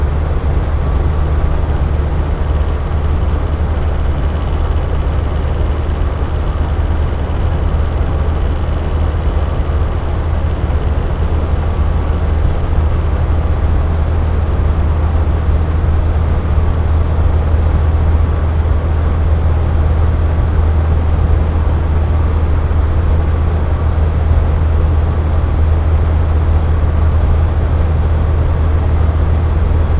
Sound effects > Objects / House appliances
Air Conditioner 03
I placed a single Rode M5 microphone, connected to a Zoom H4n multitrack recorder, near the output vents of an air conditioner in my home. I then let the AC unit run from start to finish, that being what is heard in this recording. Finally, I used Audacity to normalize the audio and prepare it for sharing.
air, audacity, chill, conditioner, flow, large, movement, rattling, rode-m5, zoom-h4n